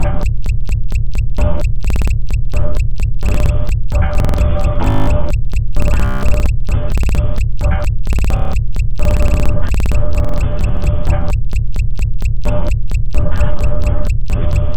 Instrument samples > Percussion
This 130bpm Drum Loop is good for composing Industrial/Electronic/Ambient songs or using as soundtrack to a sci-fi/suspense/horror indie game or short film.
Loopable, Industrial, Soundtrack, Dark, Drum, Loop, Alien, Packs, Ambient, Weird, Underground, Samples